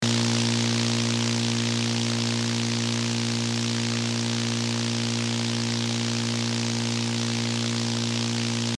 Sound effects > Electronic / Design
VHS TV Noise
VHS-like noise sound. Created with fm synth + additonal processing
noise, static, vhs